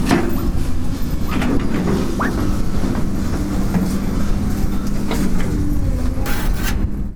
Sound effects > Objects / House appliances
Junkyard Foley and FX Percs (Metal, Clanks, Scrapes, Bangs, Scrap, and Machines) 142
Ambience, Atmosphere, Bang, Bash, Clang, Clank, Dump, dumping, dumpster, Environment, Foley, FX, garbage, Junk, Junkyard, Machine, Metal, Metallic, Perc, Percussion, rattle, Robot, Robotic, rubbish, scrape, SFX, Smash, trash, tube, waste